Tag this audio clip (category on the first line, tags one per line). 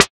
Instrument samples > Synths / Electronic
additive-synthesis
bass